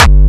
Instrument samples > Percussion
Classic Crispy Kick 1-G
It's very simple to synthed this sample, you just need to layer my punch sample of #G, and use overdrive FX to distory a sine wave bass, then layer them both. Final-Processed with: Waveshaper, ZL EQ.
powerful, Kick, distorted, brazilianfunk, powerkick, crispy